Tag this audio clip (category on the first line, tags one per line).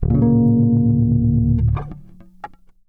Music > Solo instrument
fuzz
bass
chords
notes
funk
slides
chuny
note
pluck
riffs
rock
riff
lowend
basslines
bassline
blues
harmonic
low
pick
harmonics
electric
electricbass
slap
slide